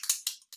Instrument samples > Percussion
Cellotape Percussion One Shot21
adhesive, ambient, cinematic, creative, design, DIY, electronic, experimental, foley, found, glitch, layering, one, organic, pack, percussion, sample, samples, shot, shots, sounds, texture, unique